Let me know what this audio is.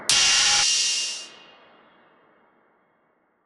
Sound effects > Electronic / Design
Impact Percs with Bass and fx-027
crunch, ominous, oneshot, bass, foreboding, hit, theatrical, impact, fx, deep, explode, brooding, low, percussion, bash, looming, perc, cinamatic, sfx, smash, mulit, explosion, combination